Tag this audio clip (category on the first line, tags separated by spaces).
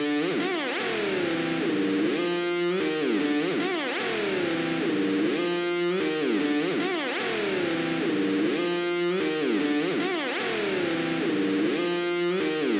Music > Solo instrument
electronic loop music looping JummBox loud noisy